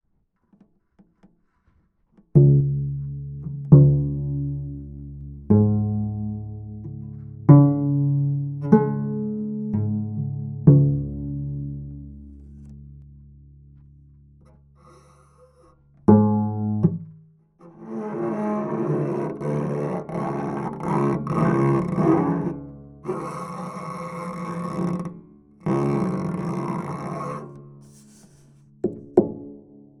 Instrument samples > String
MUSCStr-Contact Mic beat, play, strach strings SoAM Sound of Solid and Gaseous Pt 1 1
scrape, acoustic, legato, fake-play, beat, cello, hit, strings